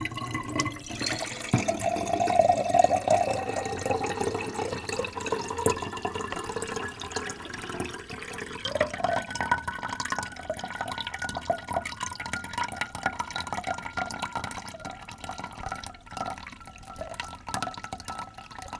Sound effects > Natural elements and explosions
tap water 1
water is poured into a glass from the tap. Recorded on the zoom H1n recorder
stream, water, trickle